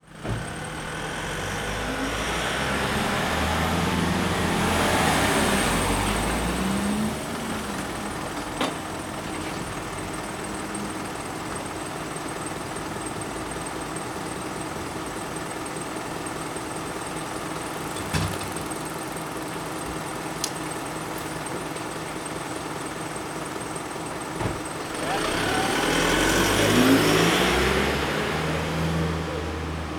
Soundscapes > Urban
Splott - Ambience Engine Noise Cars Vans Birds Train - Railway Street
fieldrecording, splott, wales